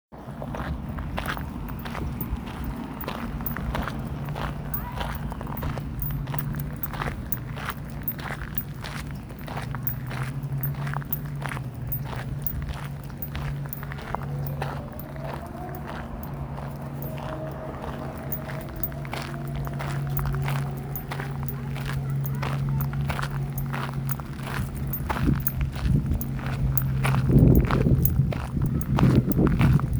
Soundscapes > Nature
walk in park
Walking in a park with a gravel trail near water
field-recording, foot, footstep, footsteps, gravel, nature, park, step, steps, walk, walking, water